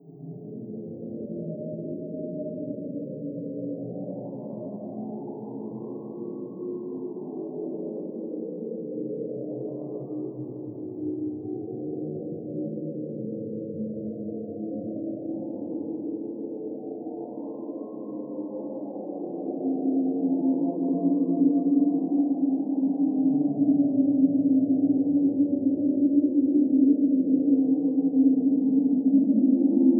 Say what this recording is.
Soundscapes > Synthetic / Artificial
Here's a sound effect I made by turning an image into sound using Fl studio's Beepmap. Than I imported it into Audacity to add FX